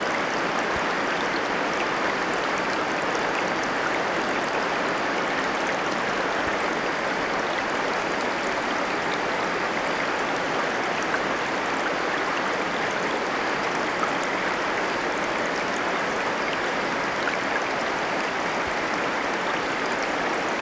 Soundscapes > Nature
River Flowing Over Rocks 2
River flowing over rocks with a trickling water sound in the background. Recorded with a ZOOM H6 and a Sennheiser MKE 600 Shotgun Microphone. Go Create!!!
nature, water, River, waterfall, rapids